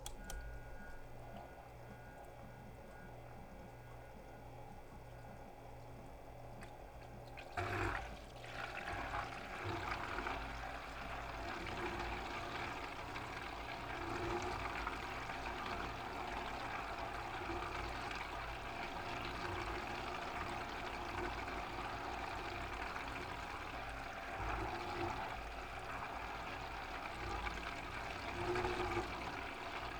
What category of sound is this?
Sound effects > Natural elements and explosions